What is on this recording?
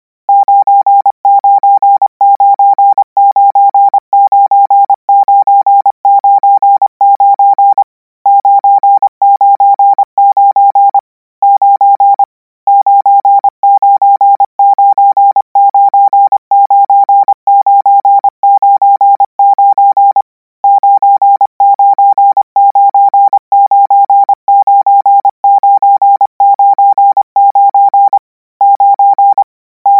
Sound effects > Electronic / Design
Practice hear number '9' use Koch method (practice each letter, symbol, letter separate than combine), 200 word random length, 25 word/minute, 800 Hz, 90% volume.